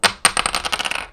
Sound effects > Objects / House appliances
FOLYProp-Blue Snowball Microphone, CU Seashell, Clatter 05 Nicholas Judy TDC
A seashell clattering.
foley, clatter, Blue-brand, seashell, Blue-Snowball